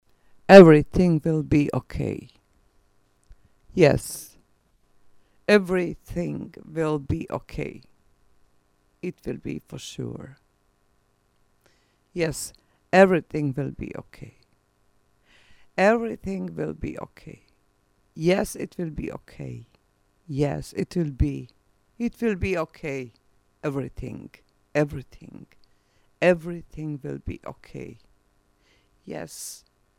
Sound effects > Human sounds and actions
Everything will be okay
for free use.
vocal, english, speak, okay, voice, text, talk, woman, everything, female, girl